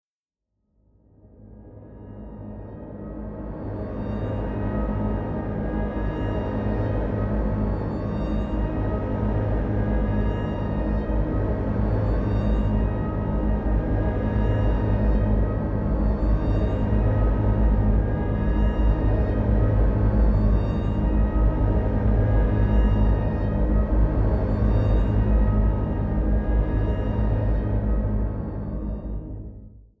Soundscapes > Synthetic / Artificial
Night Stalker - Horror Atmosphere (Preview)
Dark and eerie horror atmosphere with tense and suspenseful tones, perfect for films, games, and cinematic scenes.